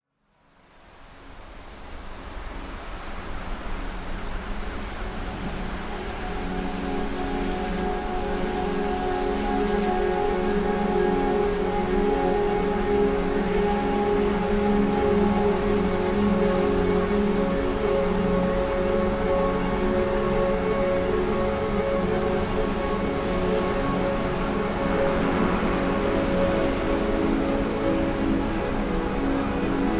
Soundscapes > Urban
Urban Hell
Slow, lush title track, rainy city with sirens, etc.
rain, urban, atmosphere, cops, riot, city